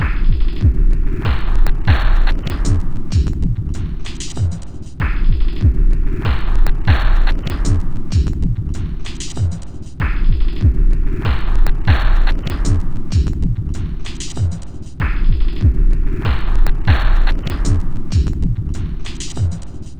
Instrument samples > Percussion
This 96bpm Drum Loop is good for composing Industrial/Electronic/Ambient songs or using as soundtrack to a sci-fi/suspense/horror indie game or short film.
Alien, Ambient, Dark, Drum, Industrial, Loop, Loopable, Packs, Samples, Soundtrack, Underground, Weird